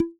Instrument samples > Other
pop from tube shaped like a candy cane
basically it's a candy cane shaped tube with candy in it (before i ate it) and i opened it to make the popping sound. it has a pitch to it so it makes an interesting starting place for making a sampled instrument.
tube, skittles, cane, pop, candy, sample